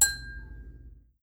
Sound effects > Objects / House appliances
FOODGware-Samsung Galaxy Smartphone, CU Glass Ding 06 Nicholas Judy TDC
A glass ding.
ding, foley, Phone-recording